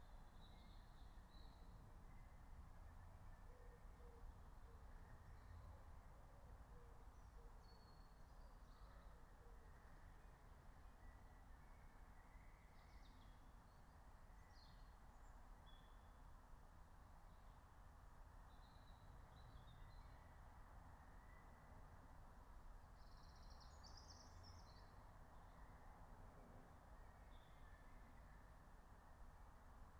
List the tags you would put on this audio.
Soundscapes > Nature
alice-holt-forest
raspberry-pi
soundscape
natural-soundscape
phenological-recording
field-recording
nature
meadow

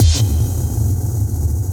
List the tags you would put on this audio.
Sound effects > Electronic / Design
explosion
foreboding
brooding
mulit
cinamatic
perc
deep
bash
fx
sfx
percussion
theatrical
low
explode
hit
combination
looming
ominous
smash
crunch
oneshot
bass
impact

